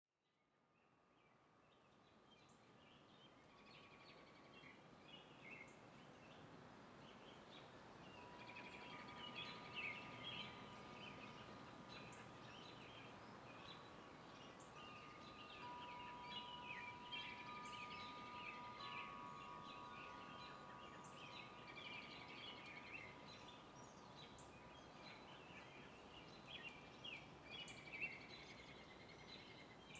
Soundscapes > Nature
Birds with Quiet Wind & Windchimes
"Field Recording" from my condo's deck featuring various chirping birds. My windchimes are also heard but they are more subdued from blowing in light winds. Edited in AVS Audio software.